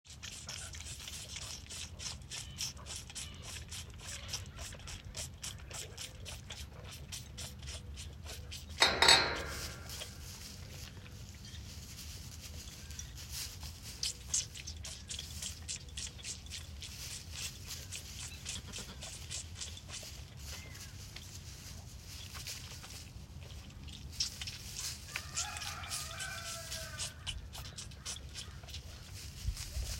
Soundscapes > Nature
Baby lambs nursing 04/21/2023
Baby lamb nursing
baby-lamb, countryside, farm, field-recordings, nursing